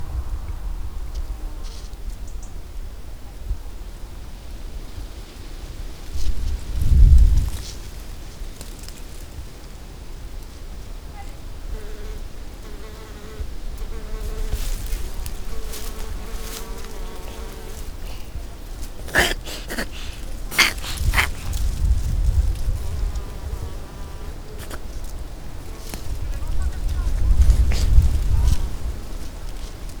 Sound effects > Animals
250830 12h02 Gergueil - Cat in bush
Subject : After finishing recording the bells in Gergueil, I noticed a cat was standing behind me. I decided to record it as it was going into a bush/high grass. Date YMD : 2025 August 30 Around 12h02 Location : Gergueil 21410 Bourgogne-Franche-Comté Côte-d'Or France. Sennheiser MKE600 with stock windcover. P48, no filter. A manfroto monopod was used as a boompole. Weather : A little windy more than the wind-cover could handle. Processing : Trimmed and normalised in Audacity.
single-mic, cat, mke600, sennheiser, 21410, outdoor, France, Gergueil, Cote-dor, pet-door, Hypercardioid, mke-600